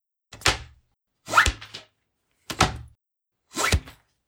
Sound effects > Objects / House appliances
Rope - Quick snatch
A rope that makes a whip-like noise. * No background noise. * No reverb nor echo. * Clean sound, close range. Recorded with Iphone or Thomann micro t.bone SC 420.